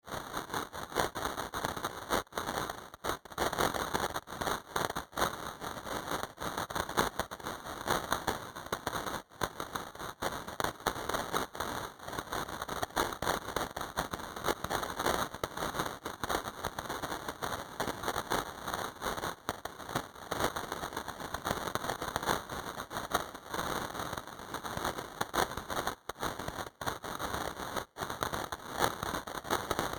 Sound effects > Objects / House appliances
Error TV 3 Noise Crushed
I synth it with phasephant! Used the Footsteep sound from bandLab PROTOVOLT--FOLEY PACK. And I put it in to Granular. I used Phase Distortion to make it Crunchy. Then I give ZL Equalizer to make it sounds better.
Error, TV